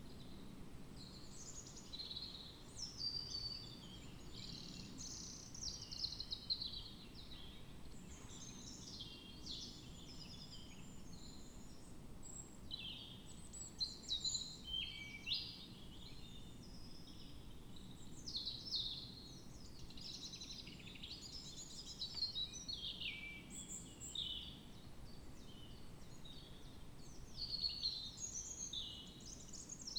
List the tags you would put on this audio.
Soundscapes > Nature
phenological-recording
soundscape
data-to-sound
alice-holt-forest
nature

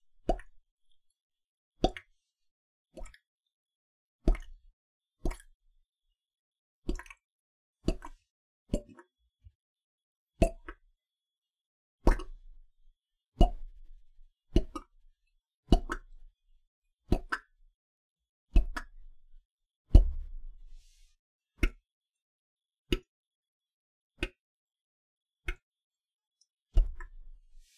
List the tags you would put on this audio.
Sound effects > Natural elements and explosions
drip
droplet
gross
dripping
leaking
cauldron
brew
faucet
muddy
pop
mouth
leak
mud
drop
soup

